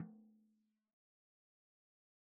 Music > Solo percussion
Floor Tom Oneshot -012 - 16 by 16 inch
floortom, kit, velocity, rimshot, fill, instrument, drums, flam, rim, perc, tomdrum, beatloop, beats, drum, tom, roll, percussion, oneshot, toms, beat, acoustic, drumkit, percs, studio